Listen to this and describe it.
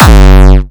Music > Other
A kick layered Grv kick13 and Filtered kick in FLstudio and Used simple FastDist plugin
Distortion, Hardstyle, Oldschool